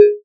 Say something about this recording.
Instrument samples > Synths / Electronic
CAN 4 Ab
additive-synthesis, fm-synthesis, bass